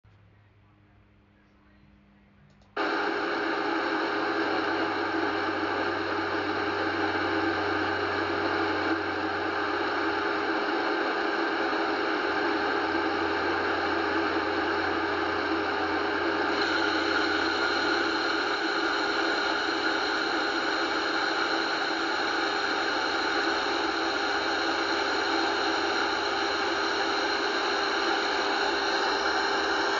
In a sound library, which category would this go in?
Sound effects > Objects / House appliances